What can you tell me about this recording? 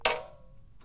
Sound effects > Other mechanisms, engines, machines
Handsaw Oneshot Metal Foley 2
hit, household, perc, metal, percussion, metallic, plank, smack, twang, saw, handsaw, foley, vibration, shop, tool, fx, sfx, vibe, twangy